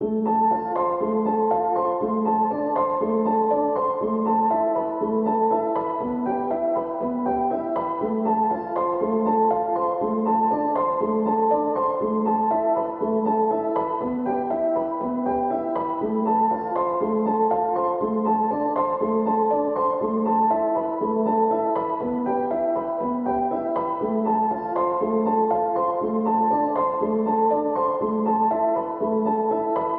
Music > Solo instrument
Piano loops 194 efect 4 octave long loop 120 bpm
120, 120bpm, free, loop, music, piano, pianomusic, reverb, samples, simple, simplesamples